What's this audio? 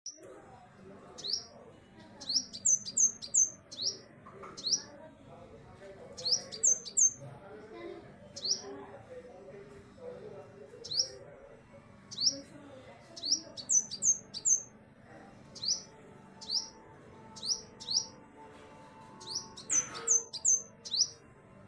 Sound effects > Animals
bird call 1
Bird calls recorded from mobile.
Bird, Nature, Song